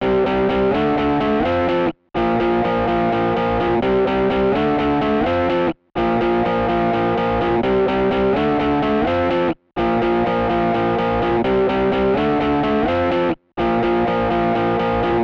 Music > Solo instrument
Otherwise, it is well usable up to 4/4 126 bpm.